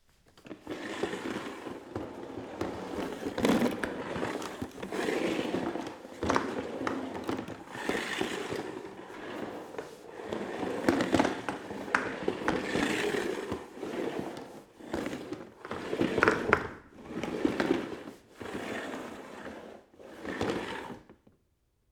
Sound effects > Objects / House appliances
250726 - Vacuum cleaner - Philips PowerPro 7000 series - Vacuum cleaner Rolling on tiled floor
7000, cleaner, Hypercardioid, MKE-600, MKE600, Powerpro, Sennheiser, Shotgun-mic, Tascam, Vacum, vacuum, vacuum-cleaner